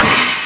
Instrument samples > Percussion
deepcrash 3 brief
• digitally low-pitched crash: 16" (inches) Sabian HHX Evolution Crash • attack 1 octave lower and attenuated the 16" (inches) Sabian HHX Evolution Crash